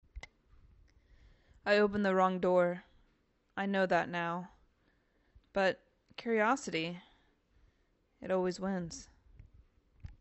Speech > Solo speech
“Wrong Door” (suspense / thriller / supernatural)
Suggests a dangerous choice with unknown consequences—great for horror games or escape-room vibes.
suspense, thriller, forbidden, Script